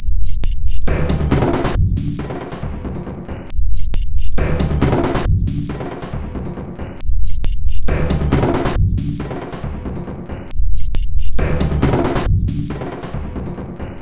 Instrument samples > Percussion
This 137bpm Drum Loop is good for composing Industrial/Electronic/Ambient songs or using as soundtrack to a sci-fi/suspense/horror indie game or short film.
Alien, Ambient, Dark, Drum, Industrial, Loop, Loopable, Packs, Samples, Soundtrack, Underground, Weird